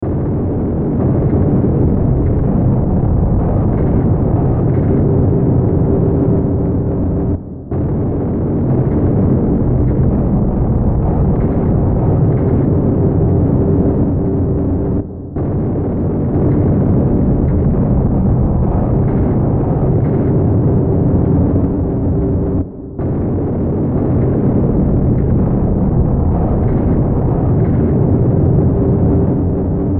Soundscapes > Synthetic / Artificial
Looppelganger #202 | Dark Ambient Sound
Use this as background to some creepy or horror content.